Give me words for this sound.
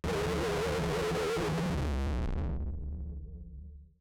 Sound effects > Electronic / Design
Jumpscare sound made using Ultrabox.